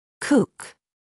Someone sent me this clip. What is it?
Speech > Solo speech
to cook
english, pronunciation, voice, word